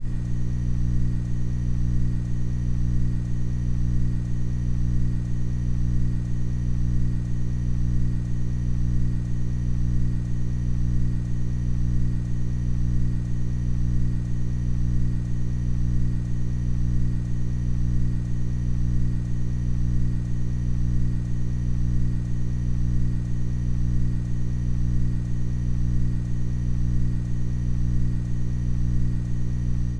Sound effects > Electronic / Design
static loop long
Shotgun mic to an amp. feedback.
interference, radio, shortwave